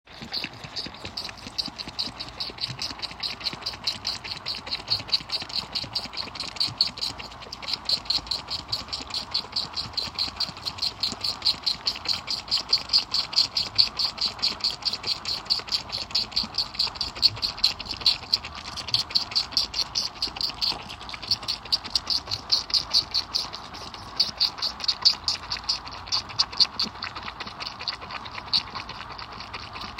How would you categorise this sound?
Soundscapes > Nature